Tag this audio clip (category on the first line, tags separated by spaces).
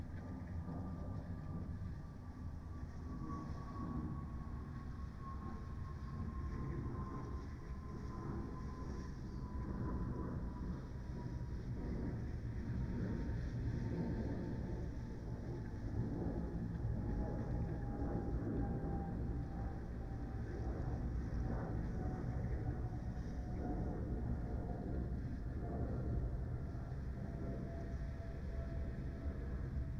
Soundscapes > Nature
artistic-intervention natural-soundscape Dendrophone field-recording sound-installation soundscape data-to-sound phenological-recording weather-data nature raspberry-pi alice-holt-forest modified-soundscape